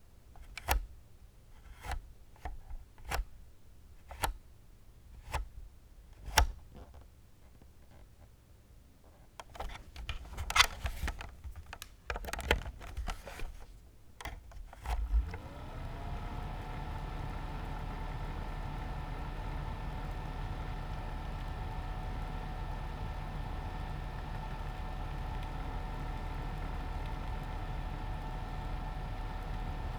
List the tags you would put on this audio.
Sound effects > Objects / House appliances
desk-fan
Tascam
MKE600
Tower-fan
Small
Fan
cylinder-blade
Add-on-Fan
Sennheiser
FR-AV2

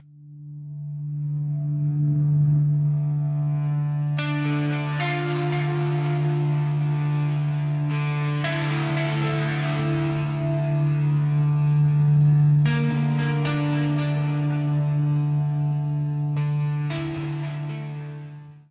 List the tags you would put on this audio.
Music > Other
BM
depressive
electric
guitar
sample

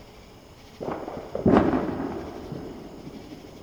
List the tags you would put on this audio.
Sound effects > Other
america
day
electronic
experimental
explosions
fireworks
fireworks-samples
free-samples
independence
patriotic
sample-packs
samples
sfx
United-States

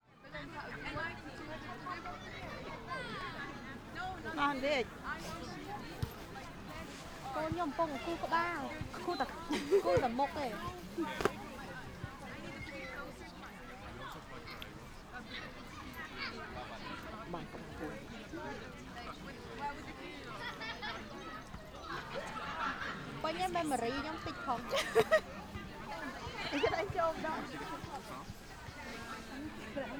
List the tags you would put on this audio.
Soundscapes > Urban
dog
play
ambience
public-park
children
birds
holiday
hanami
outdoor
adults
Asian
France
cherry-blossom
atmosphere
French
ball
soundscape
kids
joyful
Sceaux
spring
enjoy
public-garden
sakura
field-recording
people
lively

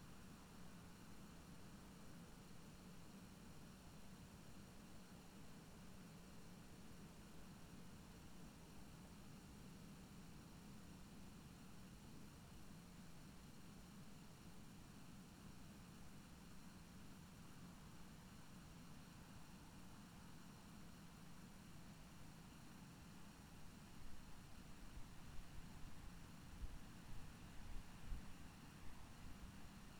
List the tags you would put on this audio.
Soundscapes > Nature
phenological-recording
alice-holt-forest
soundscape
field-recording
natural-soundscape
meadow
nature
raspberry-pi

